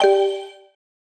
Sound effects > Electronic / Design
A short, clean synth ping with a bright upper-mid resonance and quick decay — ideal for interface alerts, menu selects, or notification cues. Has a smooth digital shimmer with a subtle tail that fades naturally, sitting somewhere between a chime and a pluck. Entirely original — no samples, no synth packs, just pure signal design and mic’d resonance. Think: the kind of tone that politely says “you did it!” instead of “error 404.”